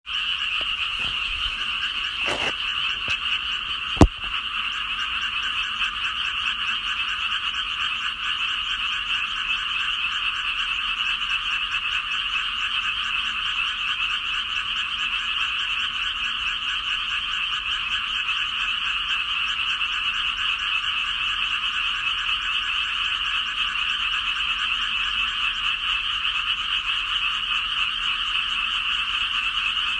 Soundscapes > Nature
Frogs by night in summer. Ansan-si, South Korea. Recorded with an Iphone 6s
croaking, frog, pond